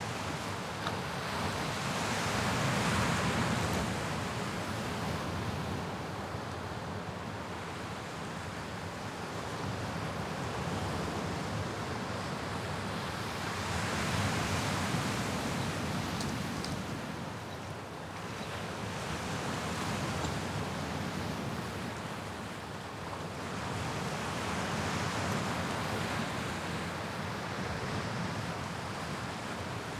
Soundscapes > Nature
Crashing waves by the coast 1
Ocean waves on the beach. Recorded on the Isle of Man, Glen Maye Beach. Recorded with Rode NTG5, Tascam DR40.
sea, waves, shore, coast, ocean